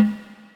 Music > Solo percussion
Snare Processed - Oneshot 159 - 14 by 6.5 inch Brass Ludwig
acoustic, beat, crack, drum, flam, fx, hit, oneshot, processed, realdrum, reverb, rim, rimshot, roll, sfx, snare, snaredrum, snares